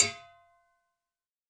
Sound effects > Objects / House appliances
Big pot side 2
A single hit of a large metal pot with a drum stick. Recorded on a Shure SM57.
metallic, percussive, single-hit, metal, percussion, hit